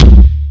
Instrument samples > Percussion
The distortions aren't audible in extreme music. With WaveLab 11 restoration you can totally unclick all files, but you have to re-attach the original attack. I compose extreme music thus I have many overboosted files. tags: overboosted bass sangban kenkeni bubinga death death-metal drum drumset DW floor floortom ngoma heavy heavy-metal metal ashiko rock sapele bougarabou Tama thrash thrash-metal tom tom-tom unsnared Africa African dundun dundunba